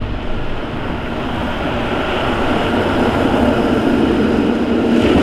Sound effects > Vehicles
Tram00079080TramPassingBy
city, tramway, field-recording, tram, vehicle, winter